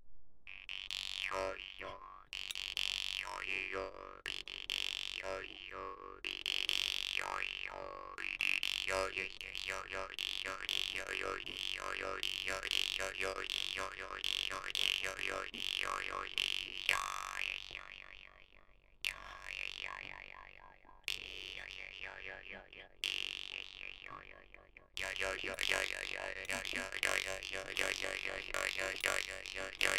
Music > Solo instrument
Vargan solo was recorded on Pixel 6pro
khomus, vargan, ethno